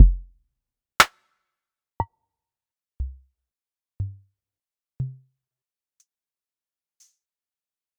Instrument samples > Percussion
Lucia Drum Kit #010
Self contained drum kit made of 8 samples equally spaced. It has kick, snare, woodblock, rimshot, thwack, cowbell, closed hihat and open hihat. It was created with the Ruismaker app. To use them, you can either chop them or, as I do, use a grid/split function and select one of the 8 slices to play.